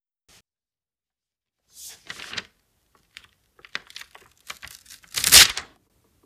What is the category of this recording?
Sound effects > Objects / House appliances